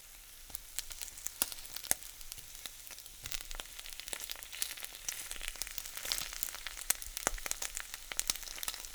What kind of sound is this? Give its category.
Sound effects > Objects / House appliances